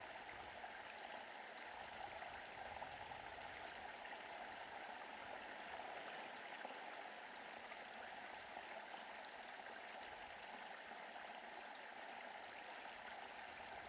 Sound effects > Natural elements and explosions
Small waterfall in a stream trickling over rocks and sticks. Captured with a Rode NTG-3.